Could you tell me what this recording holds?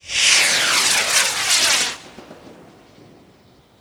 Sound effects > Natural elements and explosions
A fireworks rocket launching. Likely illegal firework haul by some group at 4AM on Jan 1st 2026 in a Berlin park recorded via Motorola Moto G34 5G. Less windy track extracted, normalized and sliced.
starting, firework, launch, start, fireworks, nye, whoosh, launching